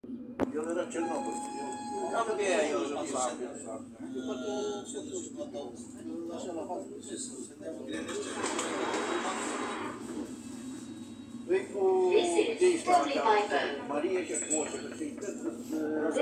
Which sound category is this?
Soundscapes > Urban